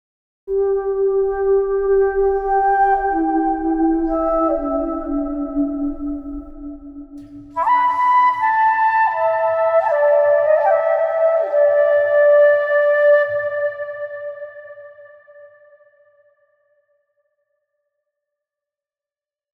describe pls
Instrument samples > Wind
Natural Native Flute with Lush Reverb
A beautiful and relaxing native Flute melody played by RJ Roush and recorded in Studio CVLT. Recorded on the Audiofuse AF Studio with a Sure Beta 57a microphone. Lightly processed in Reaper with Fab Filter